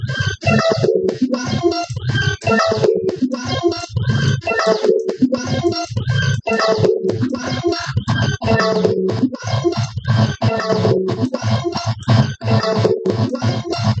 Sound effects > Electronic / Design
Stirring The Rhythms 20
wonky, noise-ambient, drowning, sci-fi, weird-rhythm, dark-techno, scifi, rhythm, vst, sound-design, PPG-Wave, industrial-rhythm, science-fiction, content-creator